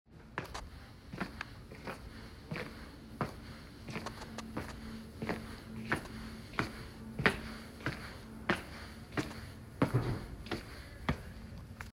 Sound effects > Human sounds and actions
Sound of Walking Steps.